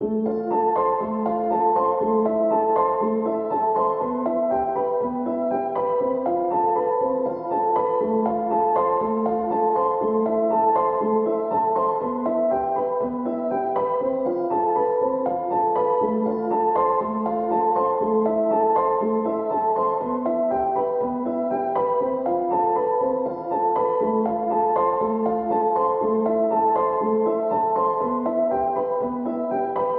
Music > Solo instrument
Piano loops 121 efect 4 octave long loop 120 bpm
pianomusic, reverb, 120, 120bpm, music, samples, piano, free, loop, simple, simplesamples